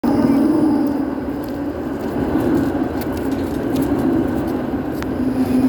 Sound effects > Vehicles
A tram is passing by and slowing down. There are also footsteps of a person walking that can be heard. Recorded on a Samsung phone.
city-center, public-transport, tram